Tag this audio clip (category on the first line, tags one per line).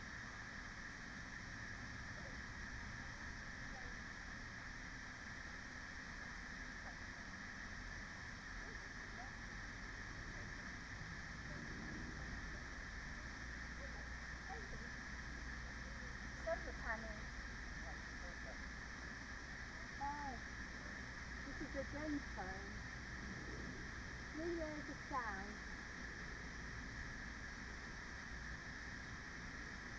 Soundscapes > Nature
field-recording
Dendrophone
sound-installation
phenological-recording
soundscape
data-to-sound
raspberry-pi
modified-soundscape
natural-soundscape
nature
artistic-intervention
alice-holt-forest
weather-data